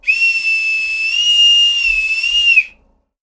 Sound effects > Objects / House appliances
WHSTMech-Blue Snowball Microphone, CU Bosun's Whistle, Heave Around Nicholas Judy TDC
A bosun whistle - Heave around.
whistle, pipe, heave-around, boatswains-call